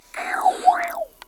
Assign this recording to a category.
Sound effects > Objects / House appliances